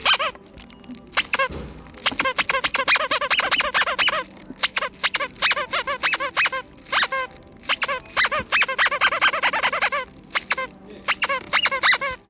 Sound effects > Objects / House appliances
TOYMisc-Samsung Galaxy Smartphone, CU Squeeze, Squeaking 01 Nicholas Judy TDC
A squeeze toy squeaking. Recorded at Lowe's.
cartoon, Phone-recording, squeak, squeeze, toy